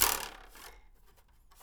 Sound effects > Other mechanisms, engines, machines
metal shop foley -146
bam, bang, boom, bop, crackle, foley, fx, knock, little, metal, oneshot, perc, percussion, pop, rustle, sfx, shop, sound, strike, thud, tink, tools, wood